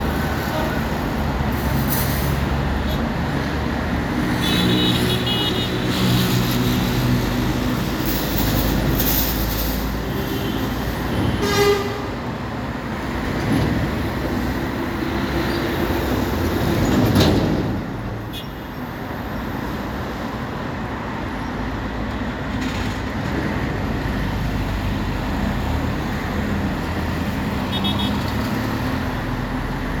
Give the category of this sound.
Soundscapes > Urban